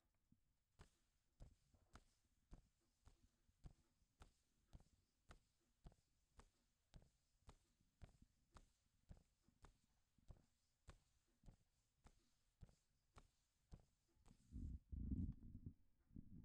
Sound effects > Human sounds and actions
I use my notebook and rub my fist onto it though the noise is quiet because I use the front
notebook, soundeffect